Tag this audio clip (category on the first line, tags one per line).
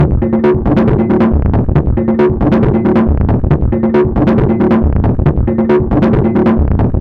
Instrument samples > Synths / Electronic
Alien
Industrial
Loopable
Packs
Loop